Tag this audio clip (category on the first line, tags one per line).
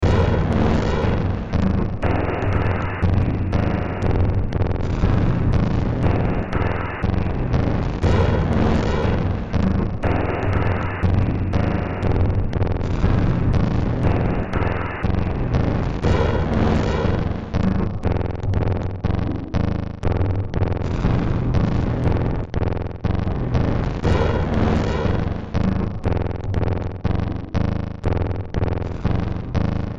Music > Multiple instruments
Noise
Horror
Underground
Industrial
Soundtrack
Sci-fi
Cyberpunk
Games